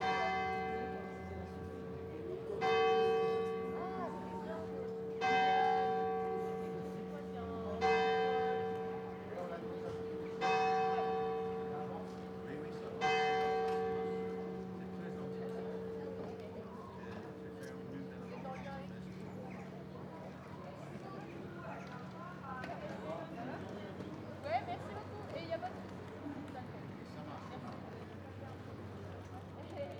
Soundscapes > Urban
Rostrenen bells market day
ambience, bells, Britany, church, field-recording, France, Market, Rostrenen, village